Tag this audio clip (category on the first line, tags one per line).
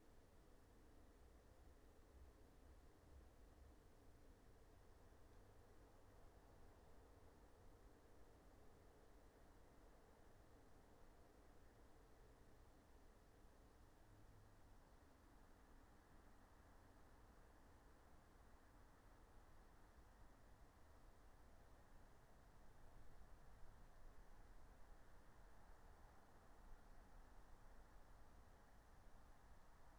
Soundscapes > Nature
natural-soundscape
field-recording
soundscape
meadow
raspberry-pi
alice-holt-forest